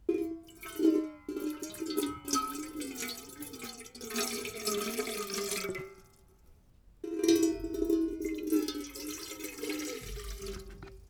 Sound effects > Objects / House appliances
pouring water in metal container
Pouring down a little water in an old metal kettle. Recorded with Zoom H2.
metal, container, pouring, few, water